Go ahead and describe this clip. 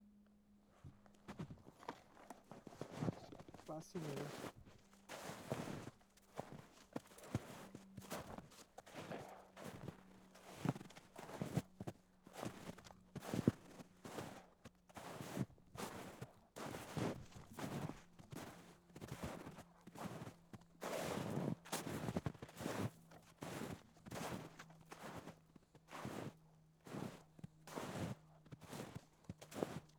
Sound effects > Human sounds and actions
footsteps snow 1
footsteps on snow recorded with zoom h6